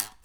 Sound effects > Other mechanisms, engines, machines
metal shop foley -140
sound, crackle, perc, metal, shop, knock, thud, tools, tink, bop, percussion, fx, sfx, pop, bam, boom, strike, oneshot, bang, foley, rustle, wood, little